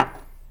Sound effects > Other mechanisms, engines, machines
shop foley-026
bam, bang, boom, bop, crackle, foley, fx, knock, little, metal, oneshot, perc, percussion, pop, rustle, sfx, shop, sound, strike, thud, tink, tools, wood